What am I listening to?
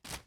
Sound effects > Objects / House appliances
Sound used originally for the action of putting something in a paper bag. Recorded on a Zoom H1n & Edited on Logic Pro.
Rustle, PaperBag, Foley, Crunch